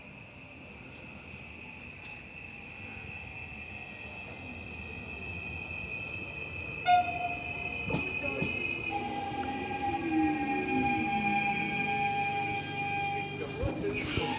Sound effects > Vehicles
"Elron" electric train arriving at a train stop and braking. Recorded with my phone.
railway, electric, passenger-train, stop, squeak, train-stop, rail, brake, electric-train, locomotive